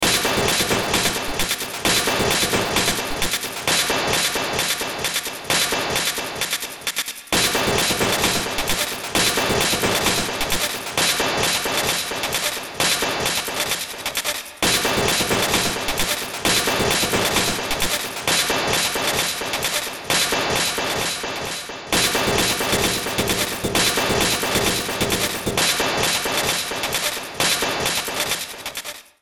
Music > Multiple instruments
Short Track #3014 (Industraumatic)
Ambient, Cyberpunk, Games, Horror, Industrial, Noise, Sci-fi, Soundtrack, Underground